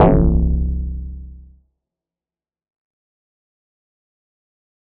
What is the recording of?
Instrument samples > Synths / Electronic
Deep Pads and Ambient Tones17
Ambient, Analog, bass, bassy, Chill, Dark, Deep, Digital, Haunting, Note, Ominous, Oneshot, Pad, Pads, Synth, Synthesizer, synthetic, Tone, Tones